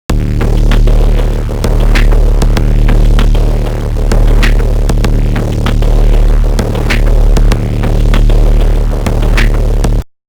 Music > Multiple instruments
new wave industrial glitchy edm idm beats loops patterns percussion melody melodies drumloop bass hip hop
bass, beats, drumloop, edm, glitchy, hip, hop, idm, industrial, loops, melodies, melody, new, patterns, percussion, wave